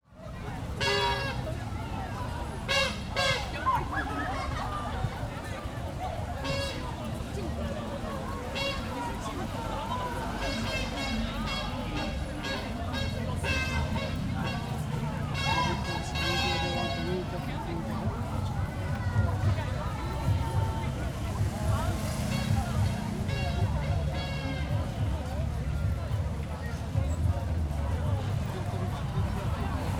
Soundscapes > Urban
Climate Justice March – Gwanghwamun, Seoul
UID: KR-SEOUL-20250927-1500-001 Recorded during a climate justice march (2025기후정의행진) in central Seoul. The procession passes through Gwanghwamun and Sejong-daero with voices, whistles from traffic safety staff, laughter, horns, and surrounding traffic. Over the everyday noise of the Gwanghwamun area, voices and laughter, whistles and horns from traffic safety staff, and the overlapping footsteps of people moving together momentarily shift the rhythm of the city into a different tempo.